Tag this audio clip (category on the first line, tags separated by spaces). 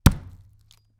Sound effects > Experimental
bones foley onion punch thud vegetable